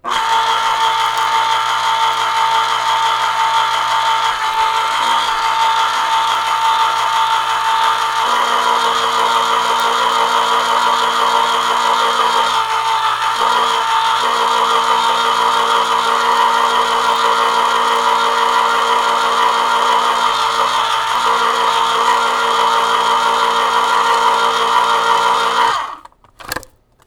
Sound effects > Objects / House appliances
Electric Can Opener 01
Here I placed a Zoom H4N multitrack recorder next to an electric can opener on top of my kitchen counter. I then plugged the household appliance into the wall and opened a can of peas. What you hear in this upload are the resulting sounds.
crunching, turning